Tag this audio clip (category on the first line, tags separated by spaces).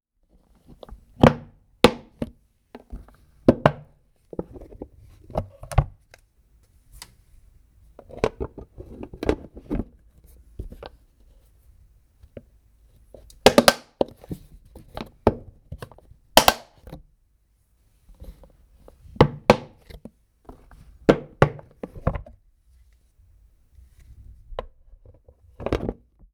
Sound effects > Objects / House appliances
Big Close Container Effects Foley Freebie Handling Open Plastic PostProduction Recording SFX Sound Zoom